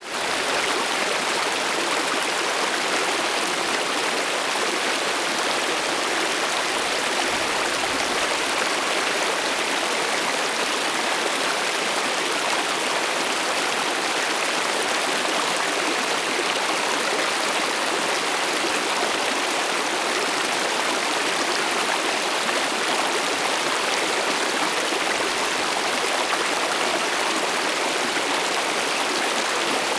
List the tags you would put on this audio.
Soundscapes > Nature
flow
sfx
brook

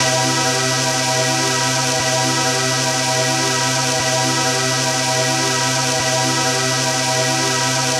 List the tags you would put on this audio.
Music > Solo instrument
Vintage
Melody
Polivoks
80s
Electronic
Synth
Analogue
Analog
Loop
Soviet
Casio
Brute
Texture

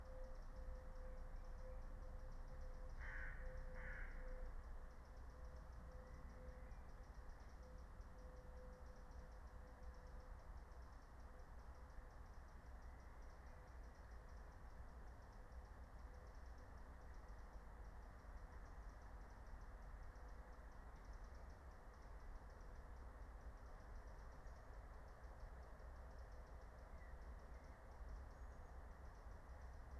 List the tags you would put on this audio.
Soundscapes > Nature
field-recording
meadow
natural-soundscape
phenological-recording
raspberry-pi

